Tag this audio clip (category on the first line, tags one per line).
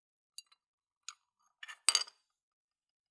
Sound effects > Objects / House appliances
ceramic
crystal
drag
foley
fx
glass
perc
scrape
sfx
tink